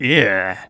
Sound effects > Human sounds and actions
Yuck Eww Disgusted Male
Man being disgusted. Ew. Not cool Male vocal recorded using Shure SM7B → Triton FetHead → UR22C → Audacity → RX → Audacity.